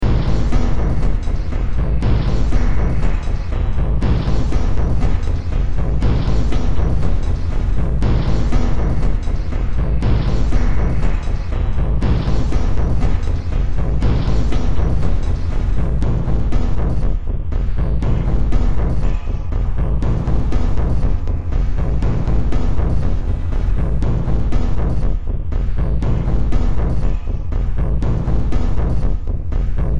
Music > Multiple instruments
Demo Track #3989 (Industraumatic)
Underground, Soundtrack, Games, Horror